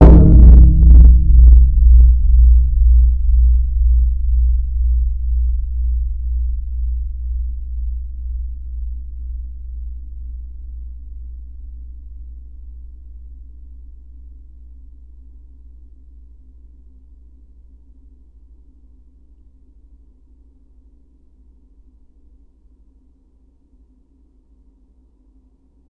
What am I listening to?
Sound effects > Objects / House appliances
Metal Fence Gong Hit Urban Percussion #001 via Low Frequency Geo Microphone
This sound is produced by hitting the metal fence with my hand. This sound is recorded with a Low Frequency Geo Microphone. This microphone is meant to record low-frequency vibrations. It is suitable for field recording, sound design experiments, music production, Foley applications and more. Frequency range: 28 Hz - >1000 Hz.
field-recording, gong, impact, metal